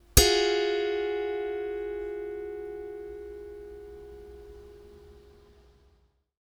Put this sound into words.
Sound effects > Objects / House appliances
shot-bangchordstring-01
A collection of sounds made banging and scratching a broken violin